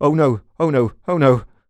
Speech > Solo speech
Fear - ohno ohno ohno
NPC, Video-game, Vocal, FR-AV2, U67, oneshot, singletake, Man, no, talk, fear, Single-take, Neumann, Human, Mid-20s, voice, dialogue, Tascam, oh, Male, Voice-acting